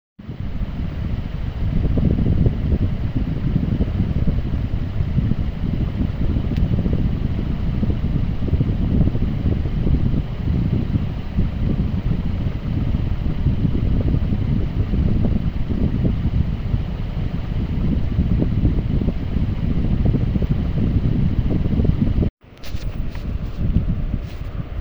Sound effects > Natural elements and explosions
Rain sound
raining with sound
raining, weather, rain